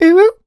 Speech > Solo speech
Other - UwU 2
Cute, dialogue, FR-AV2, Human, Kawaii, Male, Man, Mid-20s, Neumann, NPC, oneshot, singletake, Single-take, talk, Tascam, U67, UwU, Video-game, Vocal, Voice-acting